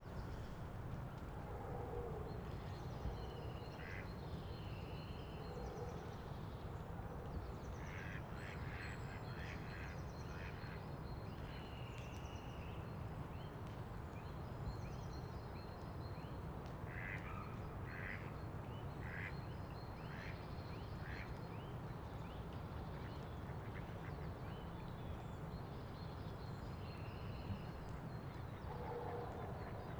Soundscapes > Nature
Countryside ambience (mono)
Mono countryside ambience featuring distant birds and barking dogs. Suitable for looping and for use as a background layer in sound design, film, television, and interactive media. Recorder: Zoom H5 Mic: Rode NTG 5